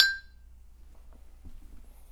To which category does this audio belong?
Sound effects > Objects / House appliances